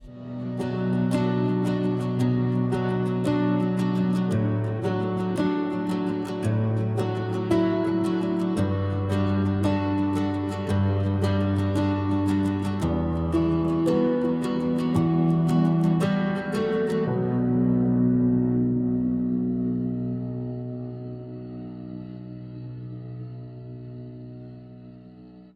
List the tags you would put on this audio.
Music > Other
BM depressive electric guitar sample

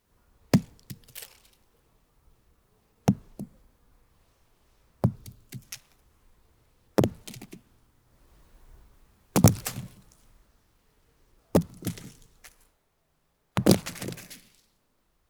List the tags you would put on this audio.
Sound effects > Natural elements and explosions
cone field-recording ground impact pine-cone thud

